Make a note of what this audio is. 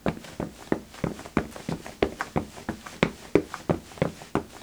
Sound effects > Human sounds and actions
Footsteps w Clothes Loop
Heavy boots walking on tile floor loop with body/clothes shuffling movement sound.